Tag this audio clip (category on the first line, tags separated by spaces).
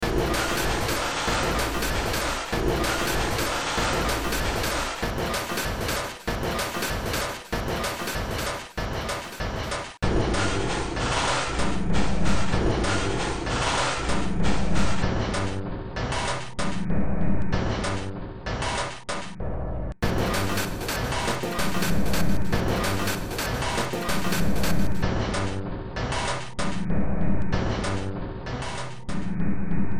Music > Multiple instruments
Industrial Horror Games Noise Underground Cyberpunk Ambient Sci-fi Soundtrack